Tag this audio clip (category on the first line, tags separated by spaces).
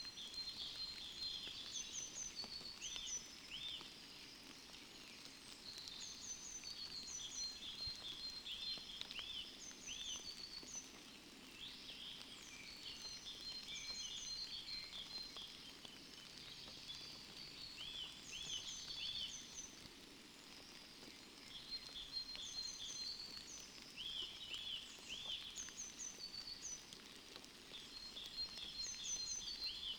Soundscapes > Nature
sound-installation Dendrophone natural-soundscape field-recording raspberry-pi phenological-recording data-to-sound weather-data alice-holt-forest nature soundscape modified-soundscape artistic-intervention